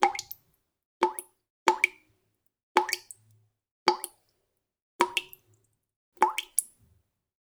Sound effects > Objects / House appliances
WATR DRIPPING FAUCET
Gotas de agua de una canilla cayendo dentro de un balde con agua dentro. Grabado con grabadora Zoom H2n. Normalización de audio y recorte de sonido ambiente entre gotas echo en post-producción. Water drops from a faucet falling into a bucket of water. Recorded with a Zoom H2n recorder. Audio normalization and ambient sound clipping between drops performed in post-production.
Liquid, Splash, Drip, Faucet, Bucket, Dripping, Water